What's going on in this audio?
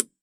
Soundscapes > Other
Fridge inside lower shelf one side to the other SW-IR
An IR Impulse Response of the inside of a fridge. A big "American" style fridge with the setup on the lower shelf, mic and speaker on opposite sides. Made by experimenting with a overly complicated "test tone" of sine-sweeps, and bursts of noise/tones. I used a 10€ speaker and a Dji mic 3. Testing that small setup by placing it in a fridge, oven and washing machine. Deconvoluted and then trimmed/faded out in audacity. You can use an IR with a convolution plugin/vst to replicate tones or reverbs/delays. 2025 12 24 Albi France.
cheap-speaker, deconvoluted, experimental, home, inside, IR, kitchen, reverb, Sine-sweep